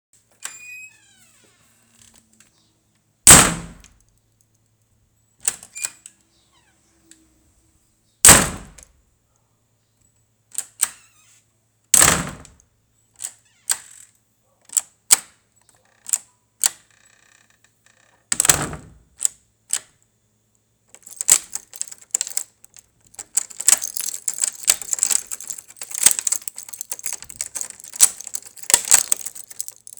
Sound effects > Objects / House appliances
PORTA - DOOR - FORTE - HARD - HINGE - DOBRADICA - CHAVE - KEY - TRINCO - BATIDA - HIT - IMPACT - 3
porta - door - forte - hard - hinge - dobradiça - chave - key - trinco - batida - hit - impact
door, close, lock